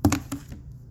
Sound effects > Objects / House appliances
GAMEBoard-Samsung Galaxy Smartphone, CU Chess, Put Down Nicholas Judy TDC
A chess board being put down.